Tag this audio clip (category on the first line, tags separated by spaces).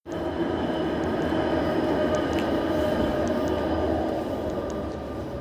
Soundscapes > Urban
tampere tram